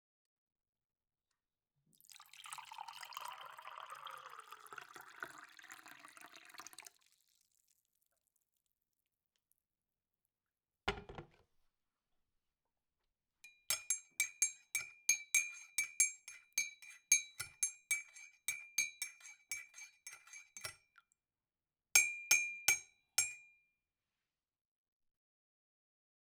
Sound effects > Objects / House appliances
FOODPour FOODGware Making a cup of tea
Making a cup of tea in a ceramic teacup, pouring water from a jug, stiring with a metal spoon.
metallic, sfx, stir, spoon, tea, metal